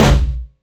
Instrument samples > Percussion
fatsnare dist 3
An alien fakesnare.
percussion, Craviotto, jazz, Slingerland, mainsnare, death-metal, drums, CC